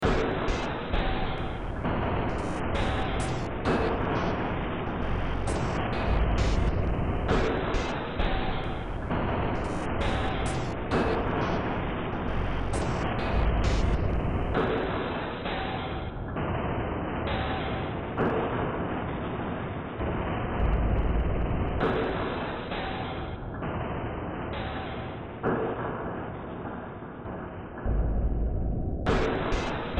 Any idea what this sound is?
Music > Multiple instruments
Demo Track #3102 (Industraumatic)
Ambient, Cyberpunk, Games, Horror, Industrial, Noise, Sci-fi, Soundtrack, Underground